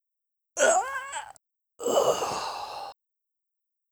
Sound effects > Human sounds and actions
The Last Gasp before death
A sound effect of a male humans last gasp before expiring/dying. One idea is for RPG game when your character dies if it fits the type of way they are killed. Many other uses for the sound also though. No edits besides trimming clip and silencing parts on either side of sound. You may want to play around with pitch and lower for the manliness of your character player character a game say if it was like a Barbarian/Warrior or something. Probably fine to leave if an Elf or Wizard i guess. Made by R&B Sound Bites if you ever feel like crediting me ever for any of my sounds you use. Good to use for Indie game making or movie making. Get Creative!
Breath, Death, Gasp, Last, Male